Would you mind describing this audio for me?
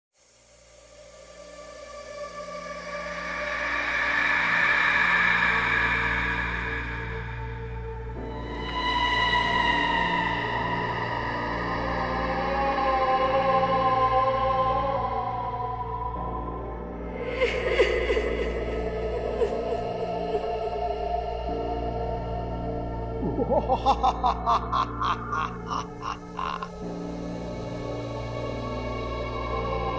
Music > Multiple instruments
Horror scary soundtrack
Alien, Ambient, Dark, fear, Sci-Fi, Scream